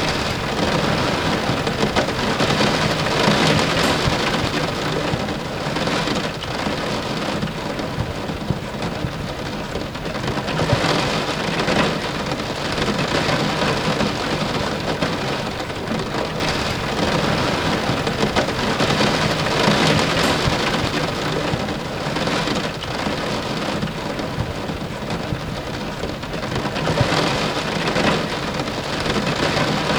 Soundscapes > Nature
Light rainfall hitting a window, subtle and soft ambience.
drops, ambience, weather, wet, light, rain, soft, window